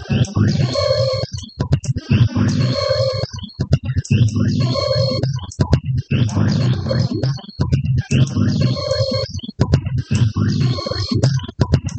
Sound effects > Electronic / Design
Stirring The Rhythms 14
content-creator, dark-design, dark-soundscapes, dark-techno, drowning, glitchy-rhythm, industrial, industrial-rhythm, noise, noise-ambient, PPG-Wave, rhythm, science-fiction, sci-fi, scifi, sound-design, vst, weird-rhythm, wonky